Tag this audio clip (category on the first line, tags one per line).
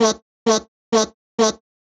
Speech > Solo speech
BrazilFunk
Vocal
FX
One-shot